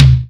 Instrument samples > Percussion
This tom is part of the Tama Star Classic Bubinga Tomset (every tom is in my tom folder). I uploaded the attacked and unattacked (without attacks) files. • tom 1 (hightom): 9×10" Tama Star Classic Bubinga Quilted Sapele → tom 2 (midtom): 10×12" Tama Star Classic Bubinga Quilted Sapele • tom 3 (lowtom): 14×14" Tama Star Classic Bubinga Quilted Sapele • floor 1 (lightfloor): 16×16" Tama Star Classic Bubinga Quilted Sapele • floor 2 (deepfloor): 14×20" Tama Star Classic Bubinga Gong Bass Drum tags: tom tom-tom Tama-Star Tama bubinga sapele 16x16-inch 16x16-inches bubinga death death-metal drum drumset DW floor floortom floortom-1 heavy heavy-metal metal pop rock sound-engineering thrash thrash-metal unsnared Pearl Ludwig Majestic timpano
timpano, thrash, pop, Tama-Star, Ludwig, DW, Majestic, heavy-metal, rock, heavy, floor, floortom-1, 16x16-inch, 16x16-inches, death-metal, metal, drumset, tom, floortom, thrash-metal, drum, bubinga, sapele, Pearl, death, unsnared, Tama, sound-engineering, tom-tom